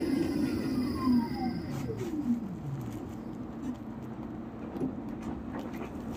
Soundscapes > Urban
final tram 25
finland, tram